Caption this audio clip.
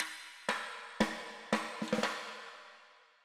Music > Solo percussion
snare Processed - sequence - 14 by 6.5 inch Brass Ludwig
snare, crack, beat, roll, acoustic, kit, snares, sfx, ludwig, rimshot, snaredrum, realdrums, oneshot, rim, hit, drumkit, processed, percussion, reverb, brass, drums, rimshots, perc, fx, realdrum, drum, flam, snareroll, hits